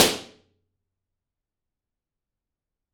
Soundscapes > Other
I&R Bathroom Esperaza - ECM999
Subject : An Impulse and response (not just the response.) of my old bathroom in Esperaza Date YMD : 2025 July 11 Location : Espéraza 11260 Aude France. Recorded with a Superlux ECM 999 Weather : Processing : Trimmed in Audacity.